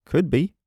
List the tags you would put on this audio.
Speech > Solo speech
FR-AV2 Male 2025 could-be july Adult Shotgun-microphone Generic-lines Shotgun-mic Calm mid-20s

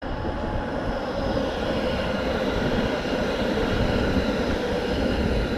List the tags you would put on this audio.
Sound effects > Vehicles
Public-transport Tram

